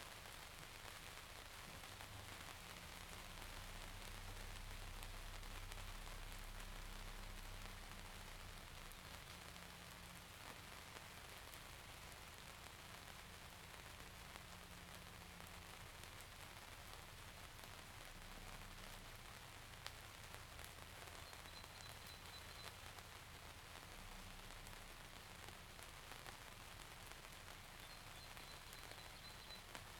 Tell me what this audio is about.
Soundscapes > Nature
24h ambiance pt-08 - 2025 04 16 12h00 - 15H00 Gergueil Greenhouse
Subject : One part out of ten of a 24h MS recording of Gergueil country side. Recorded inside a Greenhouse. Date YMD : Project starting at 20h20 on the 2025 04 15, finishing at 20h37 on the 2025 04 16. Location : Gergueil 21410, Côte-d'Or, Bourgogne-Franche-Comté. Hardware : Zoom H2n MS, Smallrig Magic-arm. At about 1m60 high. Weather : Rainy, mostly all night and day long. Processing : Trimmed added 5.1db in audacity, decoded MS by duplicating side channel and inverting the phase on right side. (No volume adjustment other than the global 5.1db).
2025, 21410, April, Bourgogne-Franche-Comte, Cote-dor, country-side, early-afternoon, field-recording, France, Gergueil, green-house, H2N, mid-day, Mid-side, MS, plastic, rain, raining, rain-shower, Rural, shower, spring, weather, windless, Zoom-H2N